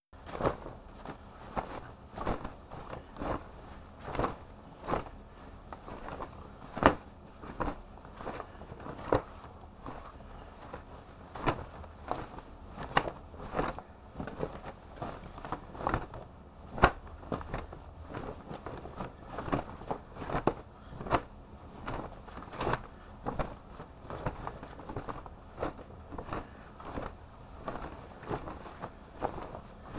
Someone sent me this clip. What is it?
Sound effects > Objects / House appliances
CLOTHFlp-Blue Snowball Microphone, CU Flag, Large, Flapping Nicholas Judy TDC
A large flag flapping.
foley, flap